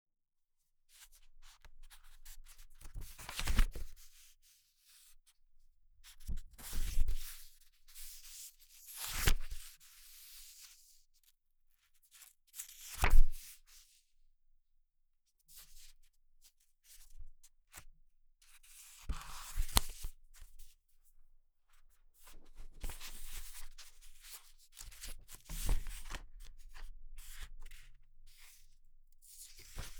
Sound effects > Objects / House appliances
FLIPPING BOOK PAGES
Little recording of me flipping through some book pages.
book, pages, paper, read